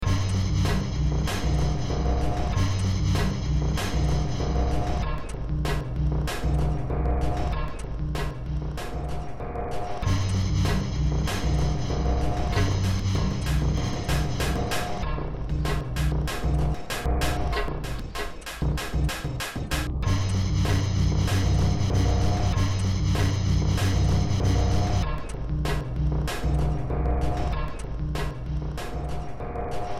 Music > Multiple instruments
Short Track #3886 (Industraumatic)
Horror, Underground, Soundtrack, Noise, Sci-fi, Industrial, Games, Ambient, Cyberpunk